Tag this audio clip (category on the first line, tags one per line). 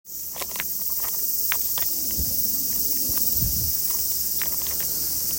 Soundscapes > Other
forest
summer